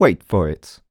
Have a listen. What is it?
Speech > Solo speech
Wait for it
singletake, wait-for-it, Tascam, Mid-20s, un-edited, hype, chant, raw, Man, FR-AV2, Neumann, voice, Single-take, Vocal, oneshot, Male, dry, U67